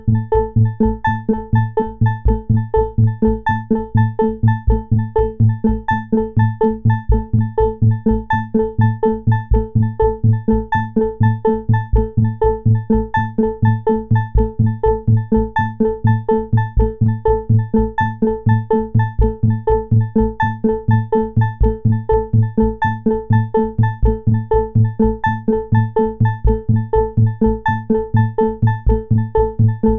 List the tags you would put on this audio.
Music > Solo instrument
FM bell

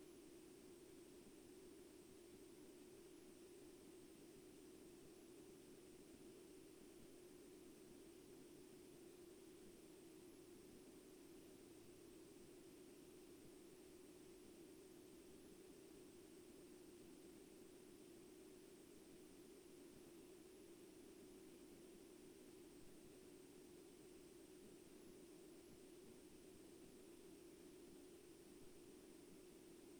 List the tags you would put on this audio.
Soundscapes > Nature
sound-installation artistic-intervention field-recording

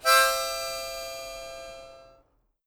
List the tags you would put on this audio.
Music > Solo instrument
Blue-brand Blue-Snowball expression harmonica idea

